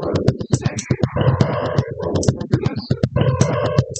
Sound effects > Electronic / Design
Stirring The Rhythms 2
rhythm, wonky, glitchy-rhythm, content-creator, noise, drowning, scifi, vst, noise-ambient, weird-rhythm, industrial-rhythm, PPG-Wave, sound-design, sci-fi, science-fiction, dark-soundscapes, dark-design, dark-techno